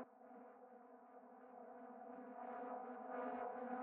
Instrument samples > Synths / Electronic
minimal Bm
I synthesize sounds, textures, rhythmic patterns in ableton. Use it and get high.✩♬₊˚. These are sounds from my old synthesis sketches.
electronic, glitch, loop, minimal, sound, sound-design